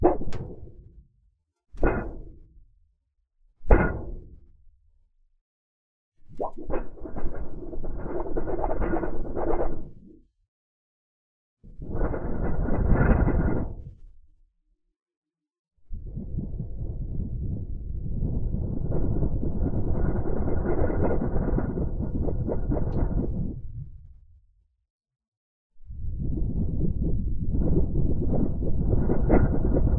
Sound effects > Natural elements and explosions
Plastic Wobbleboard Thunder Rumbling
A plastic board being wobbled back and forth at high speeds to create the sound of rumbling. Could be used for thunder, earthquakes, and more.
earthquake, quake, rage, rumble, rumbling, shake, shaking, storm, thunder, thundering, wobble, wobbleboard, wobbling